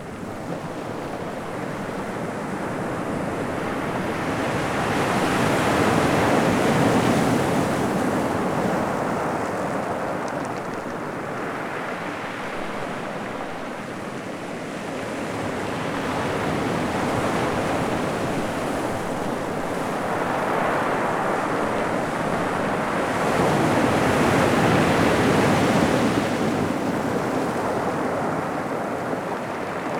Soundscapes > Nature
Closer Ocean Waves on Pebbly Beach - Iceland (loop)
Recorded at Djupalonssandur Beach in Iceland with my Tascam X6. Very light EQ applied. Loops seamlessly. In the same alcove as the previous recording, but for this one I got right up next to the water to better capture the frothy sound of the water receding over the black pebbles. Had more wind to deal with and chop out in processing but overall I think this sounds a little nicer.
snaefellsnes, shore, breaking-waves, loop, seashore, Portacapture, wave, relaxing, rocky, ambience, X6, crashing, ambient, beach, seaside, pebbly, frothy, pebble, field-recording, coast, windy, nature, Tascam, sea, ocean, iceland, water, waves, surf, Djupalonssandur